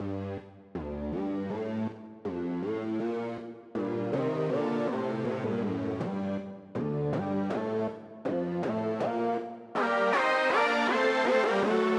Music > Solo instrument
Money Makers Synth Keys 2 - 80BPM G Minor
Synth melody in G harmonic minor at 80 BPM. Made using Vital in Reaper. Second of two parts.
electronic, melody, synthesizer, synth, keys